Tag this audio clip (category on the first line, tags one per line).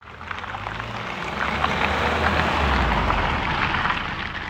Sound effects > Vehicles
car
combustionengine
driving